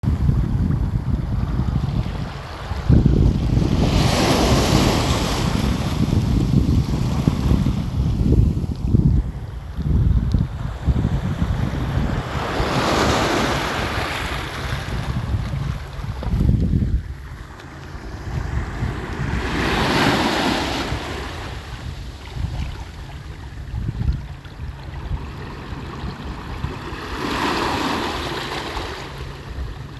Sound effects > Other mechanisms, engines, machines
Surf with buoy bell
The sound FX is of a buoy off in the distance ringing randomly. There's a surf sound to accompany the FX.
waves, water, bell, beach, surf, shore, lapping, buoy